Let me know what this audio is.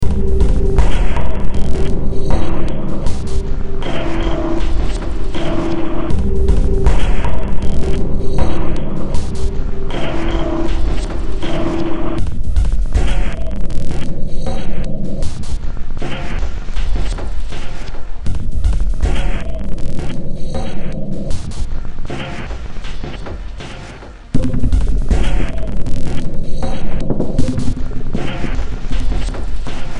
Music > Multiple instruments
Demo Track #2942 (Industraumatic)
Ambient, Cyberpunk, Games, Horror, Industrial, Noise, Sci-fi, Soundtrack, Underground